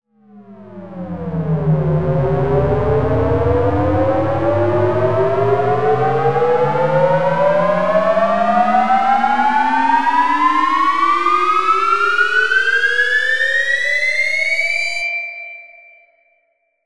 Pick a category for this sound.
Sound effects > Electronic / Design